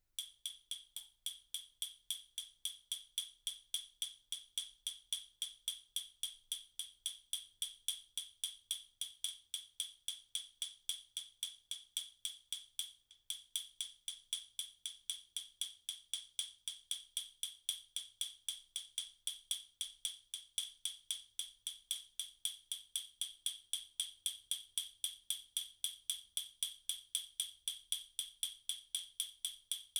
Sound effects > Other
Glass applause 6
applause, cling, clinging, FR-AV2, glass, individual, indoor, NT5, person, Rode, single, solo-crowd, stemware, Tascam, wine-glass, XY